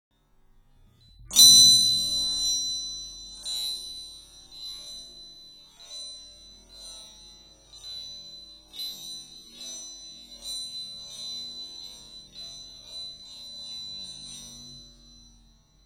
Sound effects > Objects / House appliances
Slowed down sound of a coin dropped on the bottom if a glass vase.